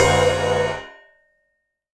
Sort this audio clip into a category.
Music > Solo instrument